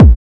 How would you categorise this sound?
Instrument samples > Percussion